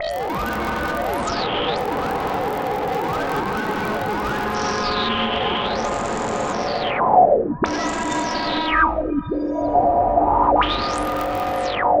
Sound effects > Electronic / Design
Roil Down The Drain 4
cinematic, content-creator, dark-design, dark-soundscapes, dark-techno, horror, mystery, noise-ambient, PPG-Wave, science-fiction, sci-fi, scifi, vst